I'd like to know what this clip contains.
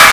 Instrument samples > Percussion
BrazilFunk Clap 3
Used a sample called ''FPC Wack'' from Flstudio original sample pack. Processed with ZL EQ, Waveshaper.
Clap, Distorted